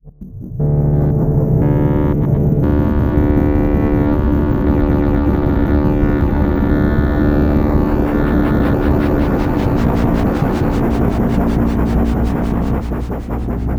Sound effects > Experimental
a weird glitchy sci-fi fx drone made with a myriad of vsts and synths, processed in reaper
scifi, space, evolving, synth, effect, ambient, glitch, deep, sound-design, pad, fx, otherworldly, sci-fi, chill, bass, drone, experimental, atmosphere, soundscape, weird, alien, creepy, dark, glitchy, synthy, spacey, sfx